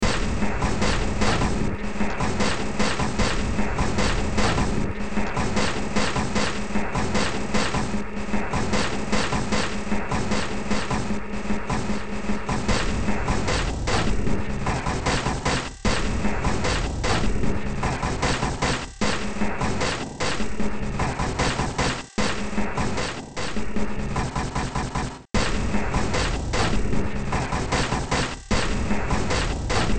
Music > Multiple instruments
Short Track #3395 (Industraumatic)
Horror, Industrial, Sci-fi, Ambient, Noise, Cyberpunk, Underground, Soundtrack